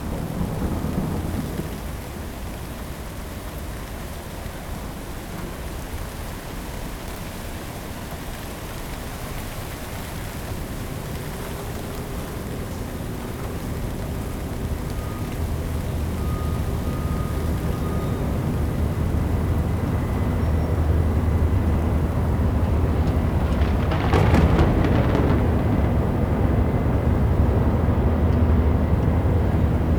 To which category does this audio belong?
Soundscapes > Synthetic / Artificial